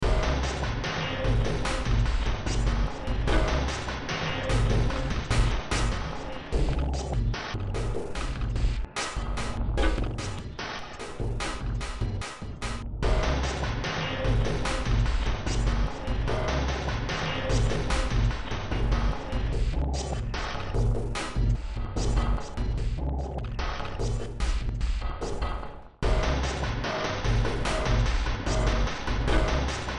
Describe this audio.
Music > Multiple instruments
Short Track #3394 (Industraumatic)
Underground, Noise, Horror, Sci-fi, Ambient, Soundtrack, Industrial, Cyberpunk, Games